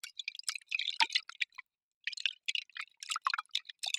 Sound effects > Objects / House appliances
Masonjar Shake 2 Texture
Shaking a 500ml glass mason jar half filled with water, recorded with an AKG C414 XLII microphone.
mason-jar, splash, trickle